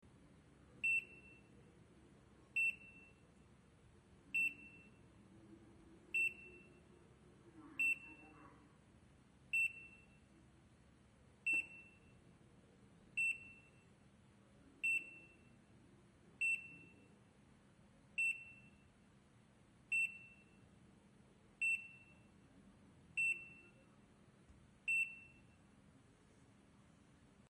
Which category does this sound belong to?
Sound effects > Objects / House appliances